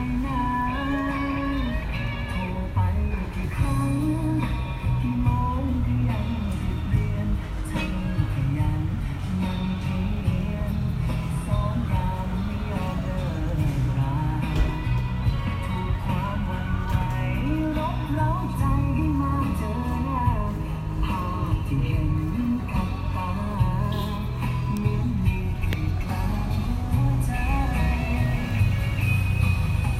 Soundscapes > Urban
Street Music, Thanon Si Ayutthaya, Bangkok, Thailand (Feb 23, 2019)
ackground music on Thanon Si Ayutthaya, Bangkok. Urban background sounds and ambiance.
instruments, Bangkok, Thanon, Ayutthaya, music, Si, voices, Thailand, performance, ambient, street, urban